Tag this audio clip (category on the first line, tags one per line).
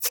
Sound effects > Other
game
interface
paper
rip
scrunch
tear
ui